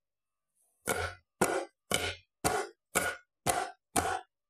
Music > Solo percussion
Drum hits 2
Loop drum beats Made with tapping an object like the side of an old drum